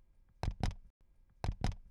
Sound effects > Vehicles
Tiresbouncing over sewer cover
Car passing over a sewer cover
bounce, sfx, Tires